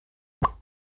Sound effects > Objects / House appliances
Opening Magic Potion/Exilir 3
My original old recording for pretty popular so i have made x3 more different versions of opening a magic potion/exilir for an RPG game. See description below a wrote for my orignal recording for what you could do when combining sounds with it... A sound effect of opening a magic potion. The character pops of the the cork lid and then once you add your following sound effects drinking the exilir. Also some bubbling sounds could be cool as well as a magical sound effect added as well. It would be great to be used in an rpg game followed by a drinking/gulping sound then a satisfied arrrgghh! Thats just how i picture it like the good ol' rpgs they used to make. Could be used for anything elese you can think of as well. It only has been edited to remove background noise of either side of clip and also volume was amplified by 5db overall due to a quiet recording. Helps to say if you need to quit down or rise volume you know where the baseline is.
Elixir, Magic, Potion, RPG